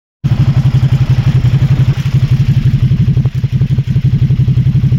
Sound effects > Other mechanisms, engines, machines
puhelin clip prätkä (8)
Motorcycle, Supersport